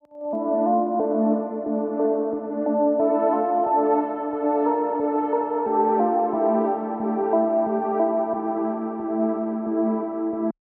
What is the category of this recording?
Music > Multiple instruments